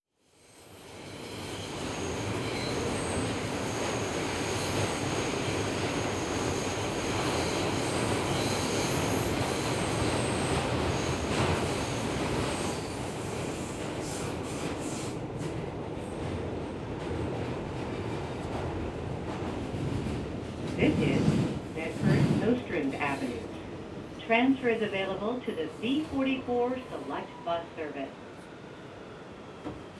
Soundscapes > Urban
Riding inside NYC train
Inside a Church Av bound G train. Recorded using a Zoom H4n Pro.